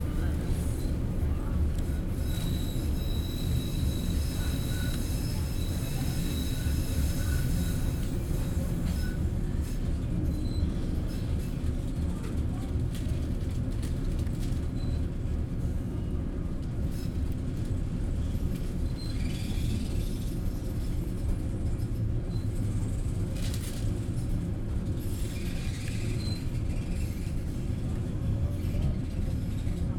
Soundscapes > Urban
metro ride through a small tunnel
A portion of a metro ride out of Newcastle. Recorded on a Zoom H2n.
city, newcastle, chatter, publictransport, metro, announcement, urbanlandscape, train, zoom